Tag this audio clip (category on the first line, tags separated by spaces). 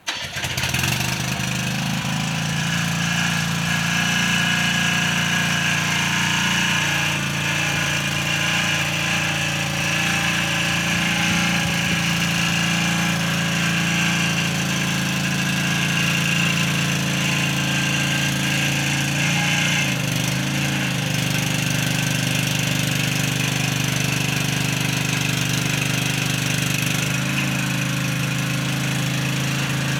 Sound effects > Other mechanisms, engines, machines
engine motor rev shutoff start